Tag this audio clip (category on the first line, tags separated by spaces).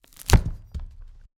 Sound effects > Experimental
bones foley onion punch thud vegetable